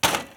Sound effects > Other
Plastic colliding. Recorded with my phone.
impact, collide, plastic